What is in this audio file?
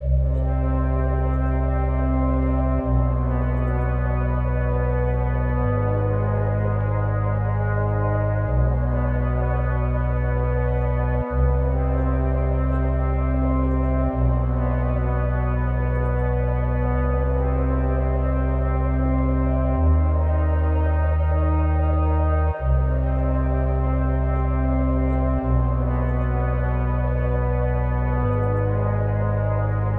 Music > Multiple instruments
background atmos loop. 85bpm. 44,100.
game, atmos, film, dark, brass, atmosphere, loop, background